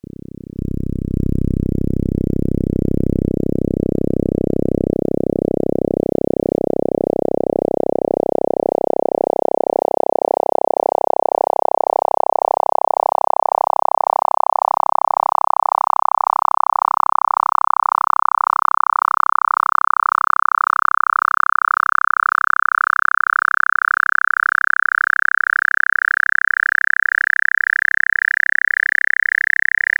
Instrument samples > Synths / Electronic
07. FM-X RES2 SKIRT6 RES0-99 bpm110change C0root
MODX, Yamaha, FM-X